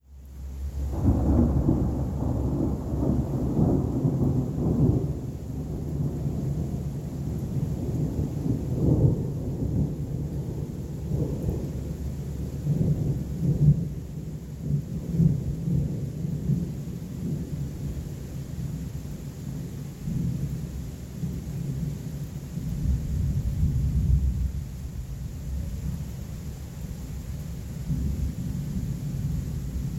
Sound effects > Natural elements and explosions
THUN-Samsung Galaxy Smartphone, CU Thunder, Rolling, Muffled, Brief Prop Plane Noise at End Nicholas Judy TDC
A rolling thunderclap. Sounds muffled. Brief prop plane noise at end.
thunder, muffled, thunderclap, clap, prop-plane, noise, Phone-recording, roll, brief